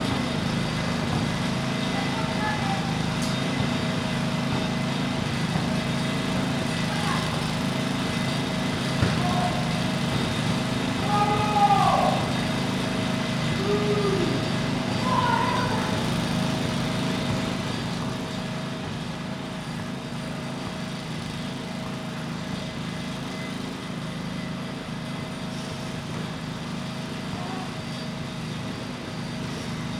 Soundscapes > Urban
20251024 ParcCanBatlloParcdeCalistenia Humans Construction Machine Noisy
Humans, Noisy, Construction, Machine